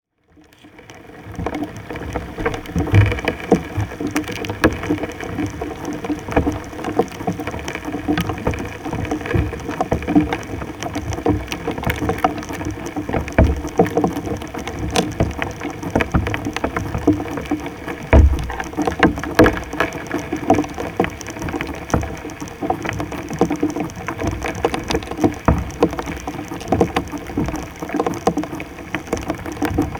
Soundscapes > Nature
Burning Bamboo Stalk
A recording of the internal vibrations of a bamboo stalk as it burns underneath a wood fire. Equipment: Metal Marshmellow Pro contact microphone.
burning, experimental, soundscape, bamboo, field-recording, ambience, nature